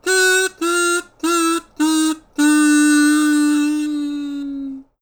Music > Solo instrument
MUSCInst-Blue Snowball Microphone, CU Kazoo, 'Failure' Accent 02 Nicholas Judy TDC
A kazoo 'failure' accent.
accent, cartoon, kazoo, Blue-brand, Blue-Snowball